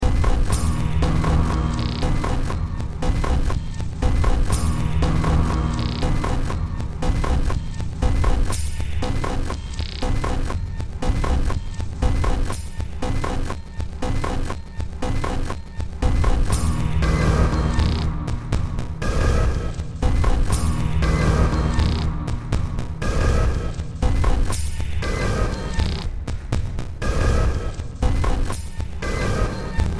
Music > Multiple instruments
Demo Track #3460 (Industraumatic)
Track taken from the Industraumatic Project.
Noise, Underground, Industrial, Cyberpunk